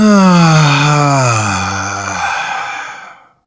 Sound effects > Human sounds and actions
Man- Sigh, weary exhalation 2
A man's weary sigh.
a, boyfriend, few, lending, me, recordings, voice